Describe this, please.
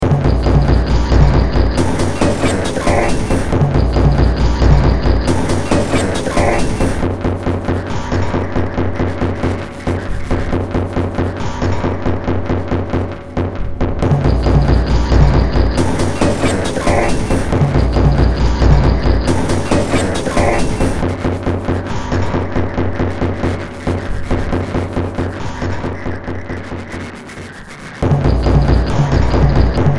Music > Multiple instruments
Short Track #3002 (Industraumatic)
Horror, Soundtrack, Sci-fi, Underground, Games, Noise, Cyberpunk, Industrial, Ambient